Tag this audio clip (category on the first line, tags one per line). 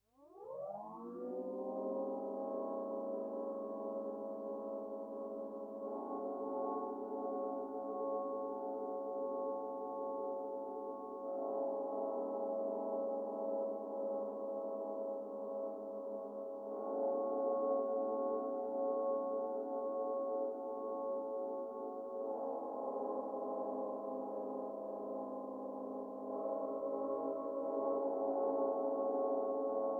Music > Solo instrument
1lovewav
analog
eerie
gliding-wurli
melodic
synth
wurli